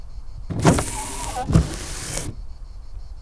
Sound effects > Vehicles
Ford 115 T350 - Windscreen wiper dry
A2WS, T350, August, Vehicle, Single-mic-mono, 115, FR-AV2, Ford-Transit, Van, 2003-model, France, 2025, Ford, Mono, SM57, Tascam, Old, 2003